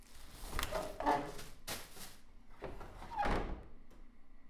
Sound effects > Human sounds and actions
Throwing away garbage
Throwing away some garbage while hanging out in the recycling room recording other things. Recorded with a Zoom H1.
garbage, impact, rubbish, throw, throwing, trash